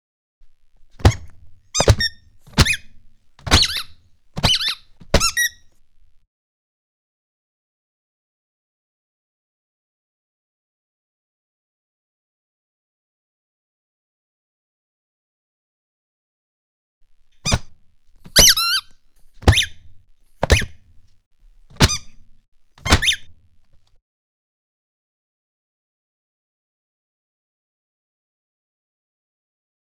Sound effects > Human sounds and actions
FX Footsteps Giant Clown 01
Here comes the giant clown!
clown, foley, footsteps, shoes, squeak